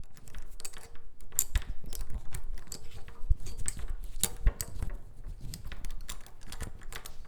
Sound effects > Objects / House appliances
My hand playing with the keys at the door of the house